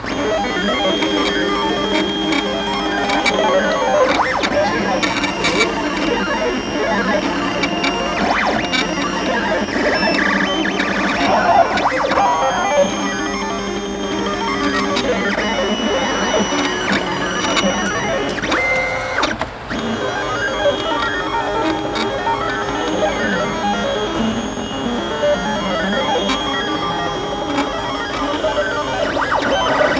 Sound effects > Other
real printer in a garage printing on some plastic sheet. Recorded with a phone samsung s21
printer, garage, plastic